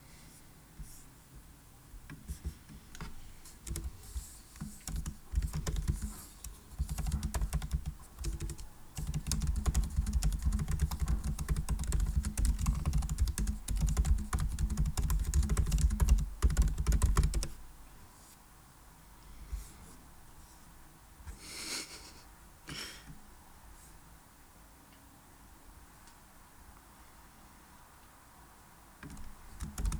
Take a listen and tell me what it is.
Sound effects > Electronic / Design
Feverish typing on a keyboard (extended periods of typing), some thought vocalisation.